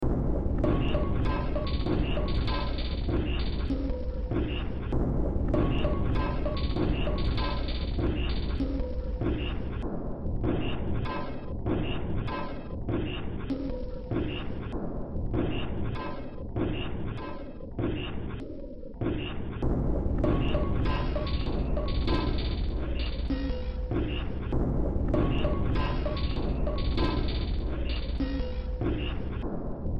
Music > Multiple instruments
Demo Track #3802 (Industraumatic)
Underground, Games, Industrial, Cyberpunk, Soundtrack, Horror, Ambient, Noise, Sci-fi